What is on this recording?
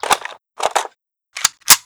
Sound effects > Other mechanisms, engines, machines
Assault rifle reloading sound.